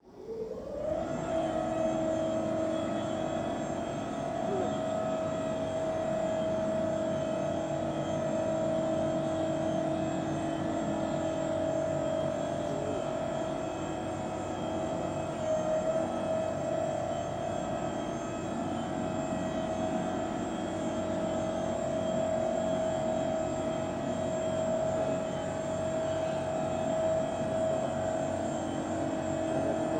Sound effects > Other mechanisms, engines, machines
Parabolic antenna movement
recorded at Medicina Radio Observatory with zoom h6